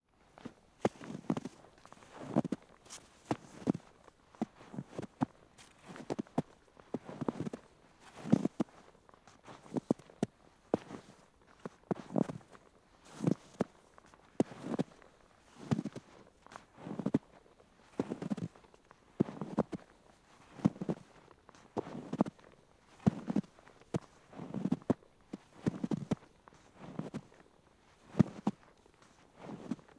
Sound effects > Human sounds and actions
footsteps on snow
footsteps snow, recorded with zoom h6
winter, snow, footsteps, walk